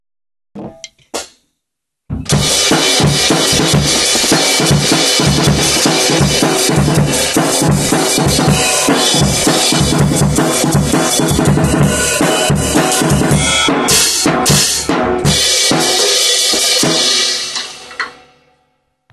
Music > Solo instrument
drum flow
recorded at mobil phone
drum, groov, phone